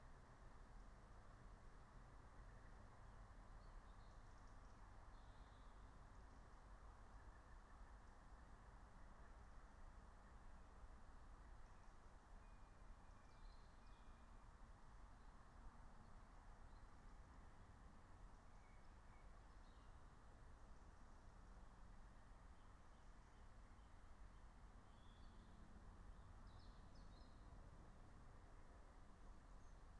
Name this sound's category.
Soundscapes > Nature